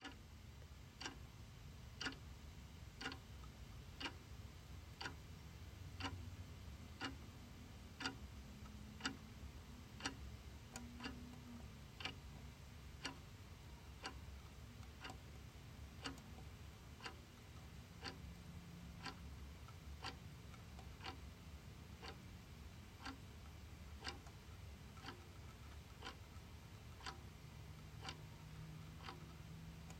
Sound effects > Objects / House appliances
Wall clock ticking. Simple enough.